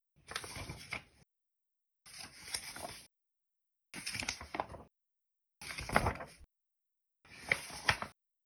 Sound effects > Objects / House appliances
paper turning
Turning pages on a magazine. Recorded on an iPhone microphone
book, flip, magazine, page, paper, read, reading, turn, turning-pages